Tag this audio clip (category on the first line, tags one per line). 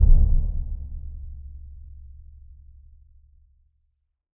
Sound effects > Electronic / Design
RUMBLING
DIRECT
DEEP
DESIGN
LAYERING
IMPACT
EXPLOSION
RATTLING
BOOM
HIT
SIMPLE
BASSY
LOW